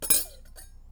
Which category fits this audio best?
Sound effects > Objects / House appliances